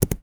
Sound effects > Objects / House appliances
MBA A key mono
Subject : A keypress from a Macbook Air M2 Keyboard. Date YMD : 2025 03 29 Location : Saint-Assiscle, South of France. Hardware : Zoom H2N, MS mode. Weather : Processing : Trimmed and Normalized in Audacity.
Close-up, H2N, individual-key, Key, Keyboard, key-press, Zoom-Brand, Zoom-H2N